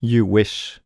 Speech > Solo speech
Cocky - You wish
cocky, dialogue, FR-AV2, Human, Male, Man, Mid-20s, Neumann, NPC, oneshot, sentence, singletake, Single-take, smug, talk, Tascam, U67, Video-game, Vocal, voice, Voice-acting, words